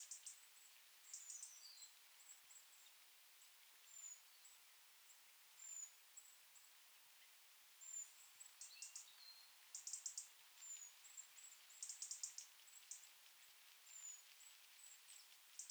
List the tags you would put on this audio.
Soundscapes > Nature
ambiance
birds
chirp
outdoors
spring
trees
wind

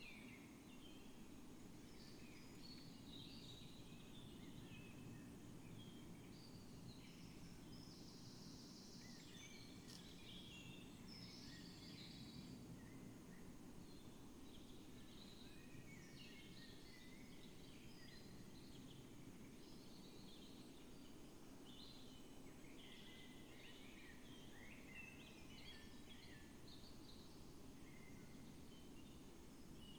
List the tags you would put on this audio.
Soundscapes > Nature
phenological-recording
natural-soundscape
data-to-sound
soundscape
sound-installation
nature
Dendrophone
raspberry-pi
alice-holt-forest
modified-soundscape
weather-data
artistic-intervention
field-recording

